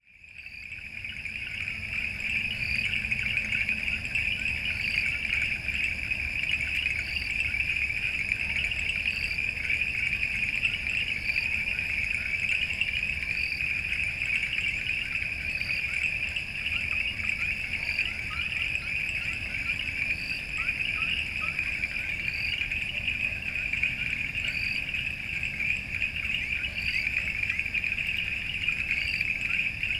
Soundscapes > Nature
Otra noche de grillos y ranas (Another night of crickets and frogs)
Otra noche de grillos y ranas cerca de la laguna, en La Matera, el 8 de abri de 2025, carca de las 11 y 43 pm. El registro fue realizado con ZOOM H6, usando la cápsula MS (Mid-Side). Luego fue editado con Audacity 3.7.3. Another night of crickets and frogs near the lagoon, in La Matera, on April 8, 2025, around 11:43 pm. The recording was carried out with ZOOM H6, using the MS (Mid-Side) capsule. It was then edited with Audacity 3.7.3. Hay en el corazón humano una generación perpetua de pasiones; de suerte, que la ruina de una es casi siempre el principio de otra. Reflexiones o sentencias y máximas morales de M. el duque de La Rochefoucauld (1824) - Narciso Alvaro. Reflexión: Retrata al corazón -metáfora del alma humana- como un hervidero perpetuo, un manantial que no cesa de brotar deseos, afectos, apegos, envidias o esperanzas, en un ciclo sin descanso ni redención… La pasión, en esta sentencia, no se extingue, solo se transforma.
Environment, Abasto, Nature, Crickets, Night, Ranas, Ambience, Laguna, Cricket, Birds, Lagoon, Frogs